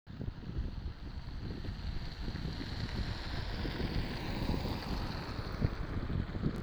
Sound effects > Vehicles
tampere car4
automobile, car, vehicle